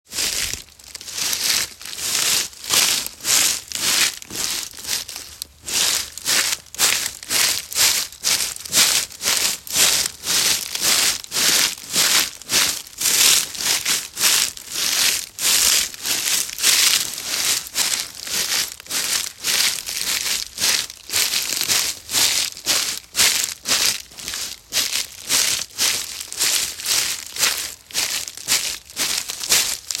Soundscapes > Nature
Mill St crashing beans shells 11/04/2024
processing dry beans
beans, processing